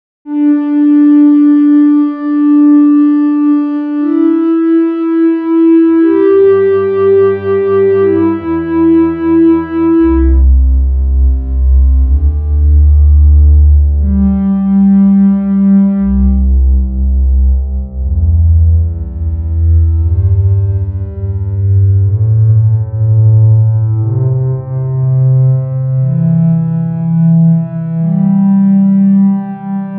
Soundscapes > Synthetic / Artificial
Granular loop in C major at 120bpm Done with Zoa MIDI generator and SpaceCraft
ambient, Cmaj, granular, loop